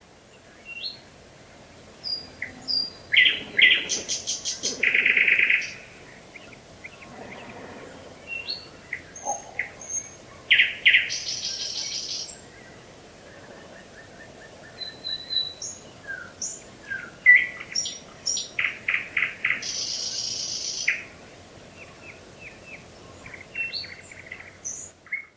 Soundscapes > Nature
Nightingale Luscinia luscinia
Nightingale, Vorsø, Horsens Fjord, Denmark. 2006. Recorder: Marantz PMD 671; Microphone: Sennheiser MKE 44P
birdsong, field-recording, nature